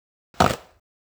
Sound effects > Objects / House appliances
A sound bite of a piece of powel towel being removed from a roll of paper towels. Made by R&B Sound Bites if you ever feel like crediting me ever for any of my sounds you use. Good to use for Indie game making or movie making. This will help me know what you like and what to work on. Get Creative!